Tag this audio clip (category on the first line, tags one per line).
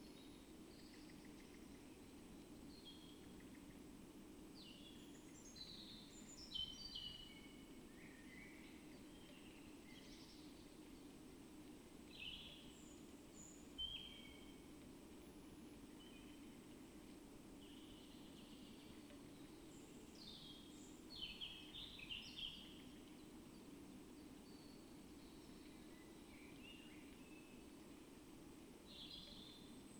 Soundscapes > Nature
Dendrophone
field-recording
artistic-intervention
raspberry-pi
modified-soundscape
alice-holt-forest
natural-soundscape
nature
sound-installation
weather-data
phenological-recording
data-to-sound
soundscape